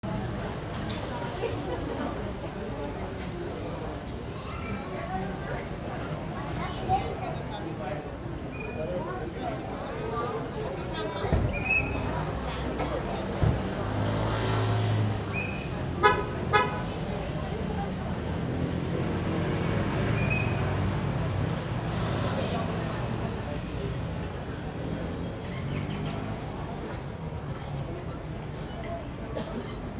Soundscapes > Urban
Camp John Hay - General Ambience 2
This audio recording is included in the Camp John Hay Sounds Collection for the General Ambience Series pack. This recording, which immerses listeners in an authentic and richly textured soundscape, was done at Camp John Hay, a historic, pine-forested former U.S. Military Base in Baguio, Philippines, which has now been converted into a popular mixed-use tourist destination. The recording was made with a cellphone and it caught all the elements of nature and humans present at the site, such as the gentle rustling of pine needles swaying with the wind, birds calling from afar, visitors walking on gravel pathways, conversations being discreetly carried out, leaves flapping from time to time, and the quiet atmosphere of a high-up forested environment. These recordings create a very lifelike atmosphere that is very wide in usage from teaching to artistic work, and even in the background to just relaxing.
ambience, atmospheric, audio, background, cinematic, design, destination, environment, environmental, forest, game, immersive, location, mixed-use, mobile, natural, nature, outdoor, pine, quiet, recording, scenic, site, sound, sounds, tourist, trees